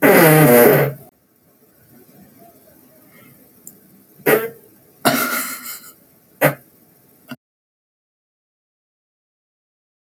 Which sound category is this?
Sound effects > Other